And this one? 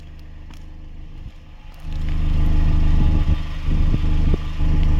Sound effects > Other mechanisms, engines, machines
clip auto (11)
Auto, Avensis, Toyota